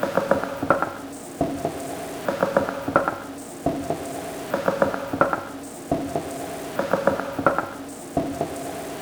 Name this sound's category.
Instrument samples > Percussion